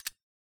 Sound effects > Other mechanisms, engines, machines
Circuit breaker shaker-005
Broken Circuit breaker, internal components generate sound when shaken. I recorded different variations of it.
shaker, percusive, sampling, recording